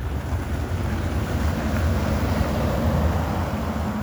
Sound effects > Vehicles
Bus sound in Tampere Hervanta Finland